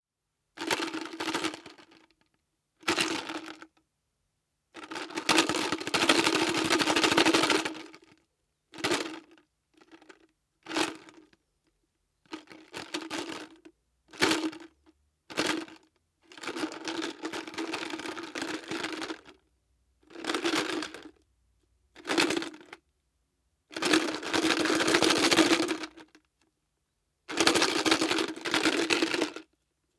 Sound effects > Objects / House appliances
The clatter of ice cubes in a plastic cup. Recorded at a recording studio.
food, restaurant, cold, drink, foley, cup, cubes, kitchen, water, bar, ice, cooking